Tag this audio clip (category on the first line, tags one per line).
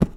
Sound effects > Objects / House appliances
liquid
pail
household
tip
bucket
object
tool
foley
lid
clang
garden
debris
slam
carry
drop
spill
kitchen
handle
pour
plastic
scoop
water
hollow
fill
knock
clatter
container